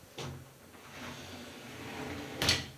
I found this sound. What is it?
Sound effects > Objects / House appliances
Shower door closing. Recorded with my phone.
closing, door, shower, slide, sliding